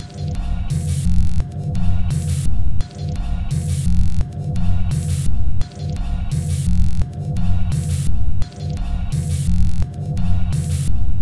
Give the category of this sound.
Instrument samples > Percussion